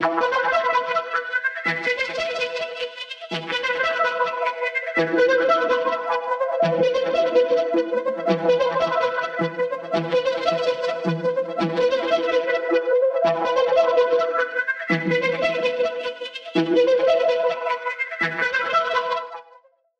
Instrument samples > Synths / Electronic
Synth Melody 02
Psytrance Sample Packs
145bpm, audacity, flstudio, goa, goa-trance, goatrance, lead, psy, psy-trance, psytrance, trance